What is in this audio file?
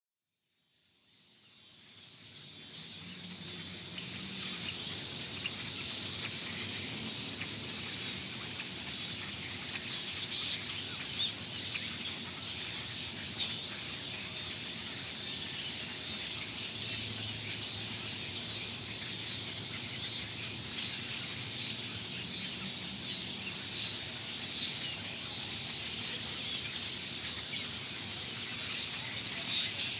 Soundscapes > Urban
AMBUrbn Late Summer Evening Chorus Cicadas Birds Insects Pedestrians Chatting Passing Vehicles Downtown Kansas City Street River Market Usi Pro AB RambleRecordings 001
This is the sound of the street outside of my apartment in Downtown Kansas City, Missouri. This was recorded on a Friday evening, around 21:00h in late August. The original intention was to capture just the birds and cicadas, but being a busy Friday night, I also caught some pedestrian chatter and vehicle traffic. My mics are a pair of Uši Pros, mounted on a stereo bar in an AB configuration on a small tripod. The mics were placed in an open window facing a parking lot and street, with a busy pedestrian path nearby. The audio was lightly processed in Logic Pro, basically just to cut the lows and very slightly boost the mid-high frequencies where the birds and cicadas were making the most noise. The weather was in the low 20s celsius, fairly humid, and overcast.
ambience, birds, chatter, cicada, cricket, evening, field-recording, insect, nature, night, pedestrians, summer, traffic